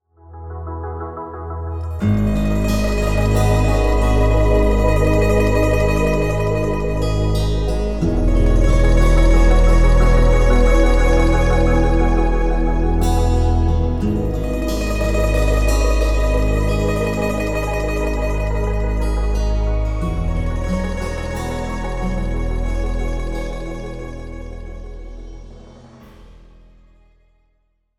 Music > Multiple instruments
Peace Amidst Chaos (Music Sample)
soulful-mandolin, save-room-theme, save-theme, horror-save-room-theme, emotional-ambient-theme, save-game-background-music, save-game-theme, reflective-music, gentle-ambient-music, reflective-ambient-theme, horror-survivor-theme, sad-mandolin, ambient-theme, horror-save-room-music, save-room, sad-ambient-music, emotional-ambient-music